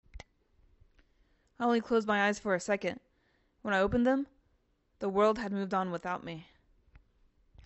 Speech > Solo speech
A mysterious, time-shifted moment that works well for sci-fi dramas or eerie thrillers.